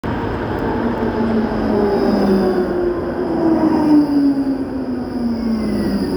Sound effects > Vehicles
A tram is slowing down speed, closing to a stop. Recorded in Tampere with a samsung phone.